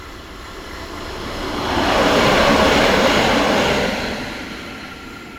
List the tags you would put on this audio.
Sound effects > Vehicles
Finland
Tram
Public-transport

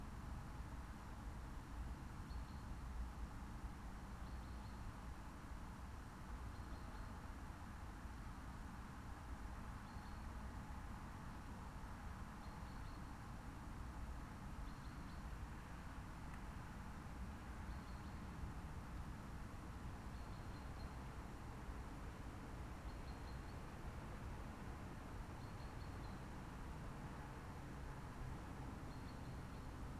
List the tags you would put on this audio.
Soundscapes > Nature
nature
field-recording
alice-holt-forest
meadow
natural-soundscape
phenological-recording
raspberry-pi
soundscape

